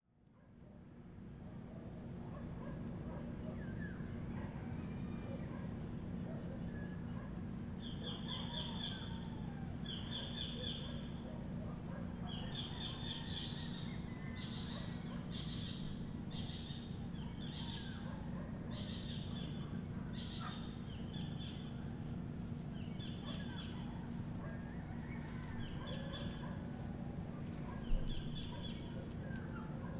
Soundscapes > Urban
250722 051113 PH Dawn chorus and light rain at sunrise in Filipino suburb
Dawn chorus and light rain at sunrise in a Filipino suburb. I made this recording at about 5:15AM, from the terrace of a house located at Santa Monica Heights, which is a costal residential area near Calapan city (oriental Mindoro, Philippines). One can hear the atmosphere of this place at sunrise, with some crickets, dawn chorus from local birds that I don’t know, roosters and dogs barking in the distance, as well as some distant fishermen’s motorboats. At #6:46, the cicadas start to make their noise, and at #11:35, the bell from the nearby church will start to ring while big dark clouds and light rain are approaching, forcing me to stop the recorder before it will become too wet ! Recorded in July 2025 with a Zoom H6essential (built-in XY microphones). Fade in/out applied in Audacity.